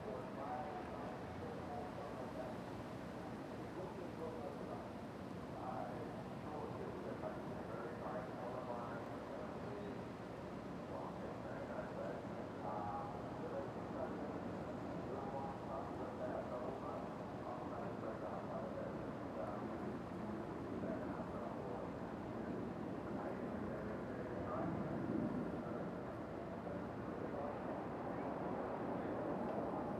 Sound effects > Vehicles
Trials for a Formula 1 race. Recorded away from the track because I don't want to buy a ticket.